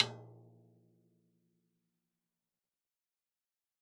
Music > Solo percussion
Med-low Tom - Oneshot 33 12 inch Sonor Force 3007 Maple Rack
perc, wood, kit, drum, real, toms, loop, flam, roll, Medium-Tom, med-tom, maple, tomdrum, oneshot, realdrum, Tom, percussion, drumkit, acoustic, beat, quality, recording, drums